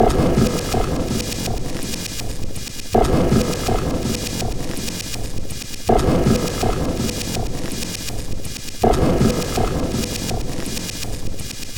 Instrument samples > Percussion
This 163bpm Drum Loop is good for composing Industrial/Electronic/Ambient songs or using as soundtrack to a sci-fi/suspense/horror indie game or short film.
Samples, Soundtrack, Underground, Industrial, Loopable, Drum, Dark, Alien, Loop, Ambient, Weird, Packs